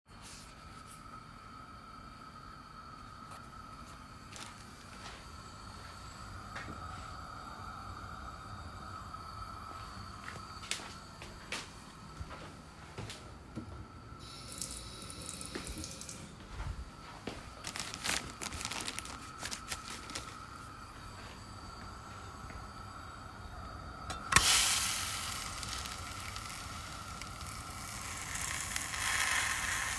Sound effects > Objects / House appliances
Light Sizzle
sizzling in a pan. Iphone recording
Cooking, pan, stove, tortilla, water